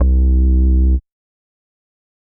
Instrument samples > Synths / Electronic
syntbas0014 C-ef
VSTi Elektrostudio (ODSay)
bass, vsti, vst, synth